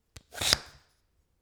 Sound effects > Objects / House appliances
250726 - Vacuum cleaner - Philips PowerPro 7000 series - Retracting brush from handle
7000, FR-AV2, Hypercardioid, MKE-600, MKE600, Powerpro, Powerpro-7000-series, Sennheiser, Shotgun-mic, Shotgun-microphone, Single-mic-mono, Vacum, vacuum-cleaner